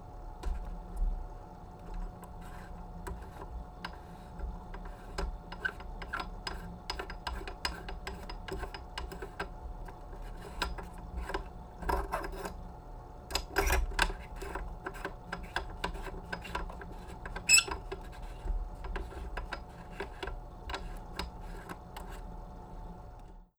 Sound effects > Other mechanisms, engines, machines
COMAv-Blue Snowball Microphone, MCU Projector, Film, 8mm, Super 8, Focus Lens, Unscrew, Screw Nicholas Judy TDC
Bell and Howell 8mm-Super 8 film projector focus lens being unscrewed and screwed with squeaks.
8mm, Blue-brand, Blue-Snowball, film, film-projector, focus-lens, foley, projector, screw, squeak, super-8, unscrew